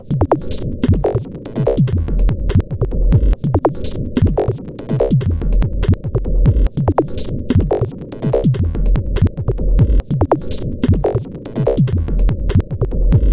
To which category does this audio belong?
Instrument samples > Percussion